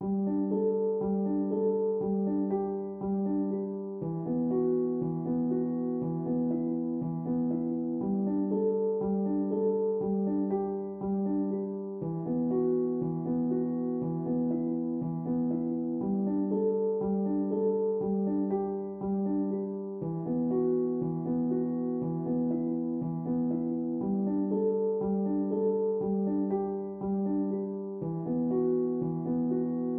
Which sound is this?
Music > Solo instrument
Piano loops 197 octave down short loop 120 bpm
pianomusic, music, samples